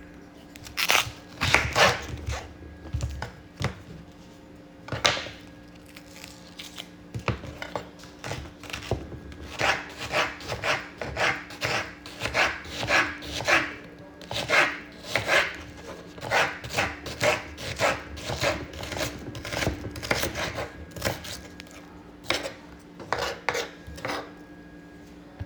Sound effects > Human sounds and actions
FOODCook chopping onion MPA FCS2
chop white onion
chopping, knife, onion, vegetable